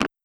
Sound effects > Human sounds and actions
LoFiFootsteps Stone Walking-05
Shoes on stone and rocks, walking. Lo-fi. Foley emulation using wavetable synthesis.
footstep, jog, jogging, lofi, rocks, steps, stone, synth, walk, walking